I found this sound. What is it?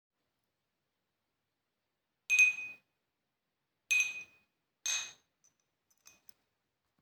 Sound effects > Objects / House appliances
glass clinking
Two common water glasses clinking together, making a sharp, clear sound. Three different types of sounds, recorded with my phone inside a dining room.